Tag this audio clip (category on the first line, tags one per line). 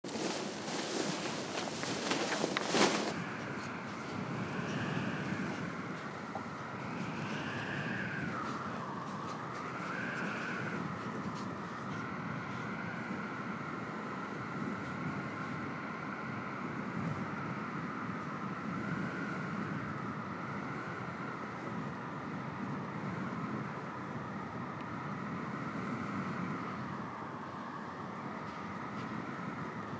Sound effects > Other
sport
wing
sky
paragliding
filed-recording
wind
flying
fly
air